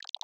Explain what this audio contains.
Instrument samples > Percussion
Organic-Water Snap 9
Snap, Botanical, EDM, Organic